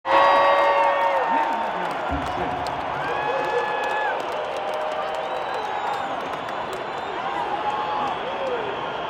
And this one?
Speech > Conversation / Crowd
Rock Concert Crowd 1
Crowd cheering at classic rock concert.
audience, shout, crowd, concert, cheers, cheer